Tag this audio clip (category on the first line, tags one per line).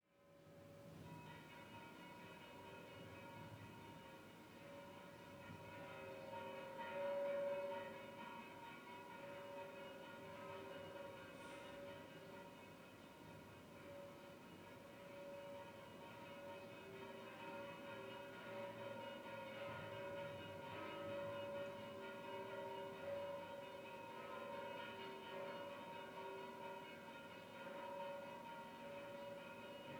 Soundscapes > Other
away
background
bells
celebratory
distant
far
Greek
Orthodox